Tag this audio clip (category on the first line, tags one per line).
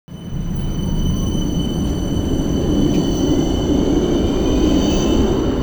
Sound effects > Vehicles
tram
vehicle